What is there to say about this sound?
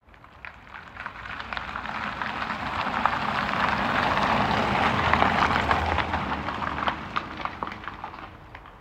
Sound effects > Vehicles
an electric vehicle driving by
vehicle, electric, driving